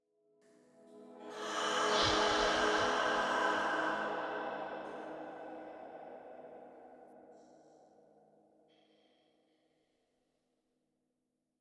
Sound effects > Experimental
The pain is released (breath sfx)
An SFX I created for a short film. It's a light, airy breath, like when tension is released. Like waking up after a long period of pain. In the movie, it was the moment when the suffering of the character goes away. (breathy1 by Vegemyte and Mystical female choir swell 02 by Johnmode)
air, airy, breath, light, release, reverb, sfx